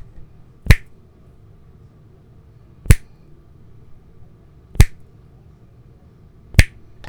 Sound effects > Human sounds and actions
Fingers Snapping several times